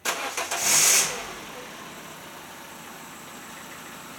Sound effects > Vehicles
Citroen C4 engine turning over and starting. Recorded with my phone.